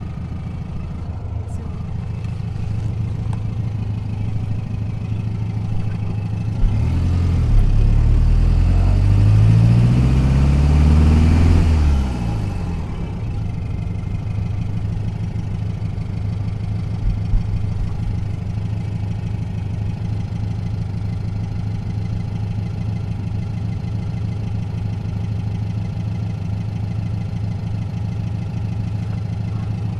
Soundscapes > Other
Moteur coccinelle - old car motor driving
Moteur de vieille voiture (coccinelle), Zoom H4 XY